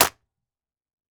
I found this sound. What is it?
Sound effects > Objects / House appliances
Subject : Stumping a soda-can flat. They were the tall 33cl cola kind. Date YMD : 2025 July 20 Location : Albi 81000 Tarn Occitanie France. Sennheiser MKE600 P48, no filter. Weather : Processing : Trimmed in Audacity. Notes : Recorded in my basement.